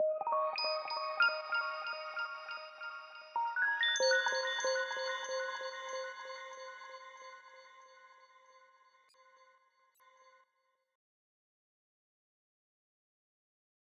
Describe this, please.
Sound effects > Electronic / Design
Magic Potion FX
A magical spell sound fx like drinking a potion, or casting an illusion, created with Phase Plant in FL Studio
adventure, ambience, ambient, astral, atmosphere, chill, cinematic, effect, fantasy, game, illusion, keys, light, magic, magical, pad, sci-fi, sfx, space, spacey, synthy, twinkle